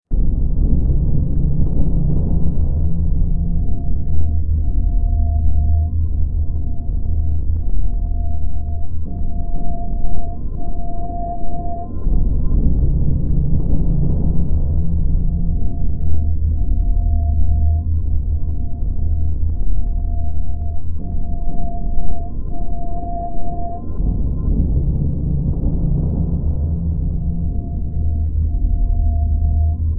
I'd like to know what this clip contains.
Soundscapes > Synthetic / Artificial
Looppelganger #165 | Dark Ambient Sound
Survival, Noise, Sci-fi, Underground, Ambience, Hill, Games